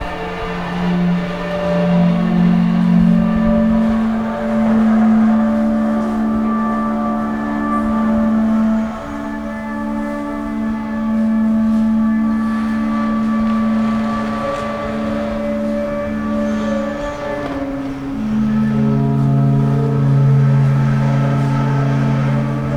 Soundscapes > Indoors
Space Drone Reverbereting - Biennale Exhibition Venice 2025
The sound of a violin transformed into a reverbereting drone Sound recorded while visiting Biennale Exhibition in Venice in 2025 Audio Recorder: Zoom H1essential
reverb, museum, exhibition